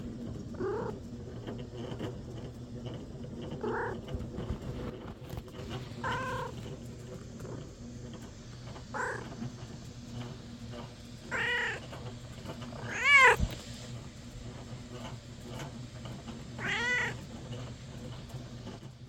Sound effects > Animals
This sound was recorded with my Samsung Galaxy S23+ using the mobile app WaveEditor and finessed with Adobe Audition.